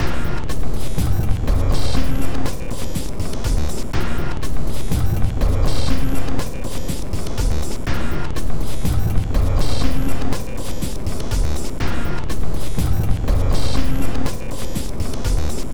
Instrument samples > Percussion
This 122bpm Drum Loop is good for composing Industrial/Electronic/Ambient songs or using as soundtrack to a sci-fi/suspense/horror indie game or short film.
Loopable, Samples, Soundtrack, Alien, Drum, Industrial, Underground, Dark, Weird, Ambient, Packs, Loop